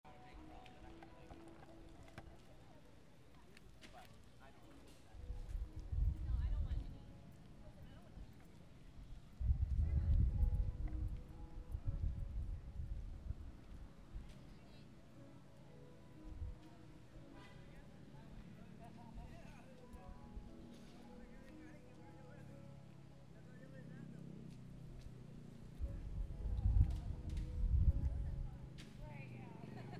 Soundscapes > Nature
sittingin park
park, conversations, music